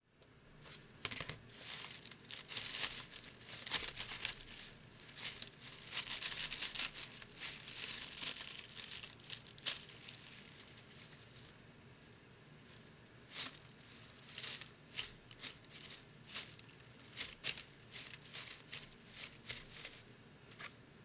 Sound effects > Natural elements and explosions
Crush of leaves beneath feet
Leaves beneath feet
underbrush, crush, walk, crunch, leaves